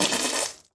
Sound effects > Human sounds and actions
HMNSpit-Samsung Galaxy Smartphone, CU Water Nicholas Judy TDC
Someone spitting water.
water, Phone-recording, human, spit